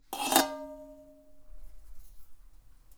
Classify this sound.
Sound effects > Other mechanisms, engines, machines